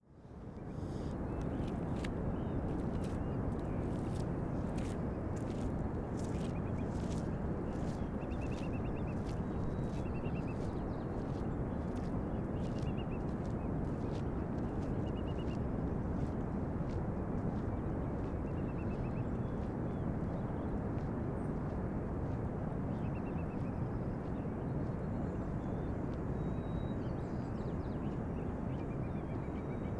Soundscapes > Nature
life on the shoreline of the bay - 12.25
An estuary soundscape.
field-recording, beach, birds, estuary